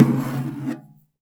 Music > Solo instrument
Toms Misc Perc Hits and Rhythms-001
Crash, Custom, Cymbal, Cymbals, Drum, Drums, FX, GONG, Hat, Kit, Metal, Oneshot, Paiste, Perc, Percussion, Ride, Sabian